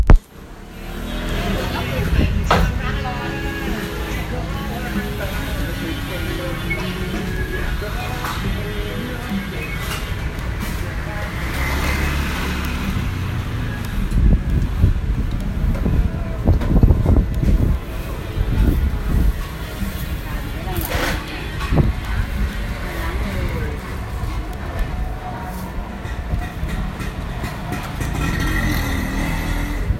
Soundscapes > Urban
Street Ambience, Chinatown, Bangkok, Thailand (Feb 22, 2019)
Field recording of lively street sounds in Chinatown, Bangkok, Thailand, captured on February 22, 2019. Includes traffic noise, vendors, and the characteristic energy of the neighborhood.